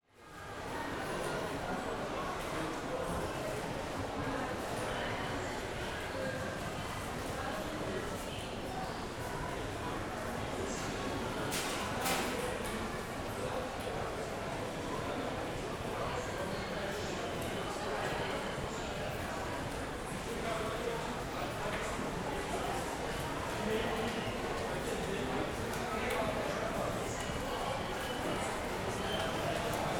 Soundscapes > Urban
AMBPubl Mall GILLE DARMSTADT Rode BFormat 8.31 4-48-24
Ambisonics Field Recording converted to B-Format. Information about Microphone and Recording Location in the title.
3D, ambisonics, ambience, spatial